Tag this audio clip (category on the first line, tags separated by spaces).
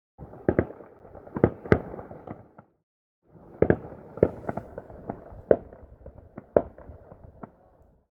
Sound effects > Natural elements and explosions
explosion fire firework gun shot